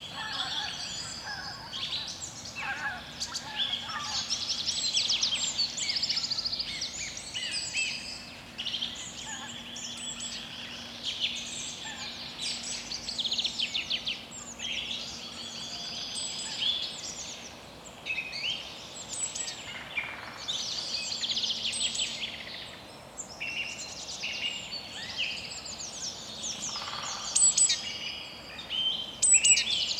Soundscapes > Nature
Forest atmosphere (localization Poland)
environmental, nature, soundscape, ambient, ambience, birds, calm, peaceful, natural, field-recording, atmosphere, wild, outdoor, European-forest, background, rural, forest, Poland, birdsong